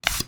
Sound effects > Objects / House appliances
Recording of a person blowing into a straw, loaded with a spitball and firing it